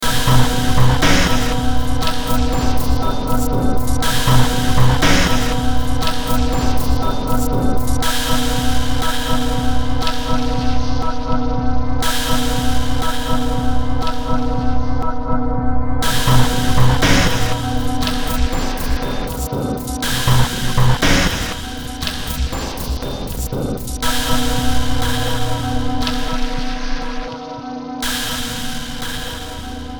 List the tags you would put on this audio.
Music > Multiple instruments
Noise
Industrial
Ambient
Cyberpunk
Underground

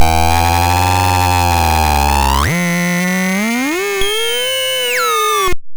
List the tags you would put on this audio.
Sound effects > Electronic / Design
Alien
Bass
DIY
Dub
Electronic
Experimental
FX
Glitch
Handmadeelectronic
Infiltrator
Instrument
Noise
noisey
Optical
Otherworldly
Robot
Sci-fi
Scifi
SFX
Spacey
Synth
Theremin
Theremins
Trippy

